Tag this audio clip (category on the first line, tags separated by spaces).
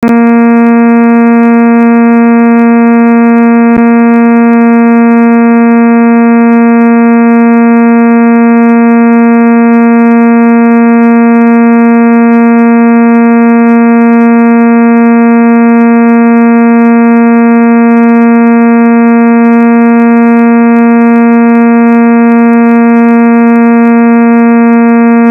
Instrument samples > Synths / Electronic
Sawtooth Square Synth